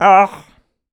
Sound effects > Human sounds and actions
Hurt - arrh - Commical
pain, Man, Neumann, Hurt, voice, Male, Video-game, Voice-acting, Single-take, oneshot, U67, FR-AV2, Human, singletake, Vocal, Tascam, Mid-20s, talk, NPC, dialogue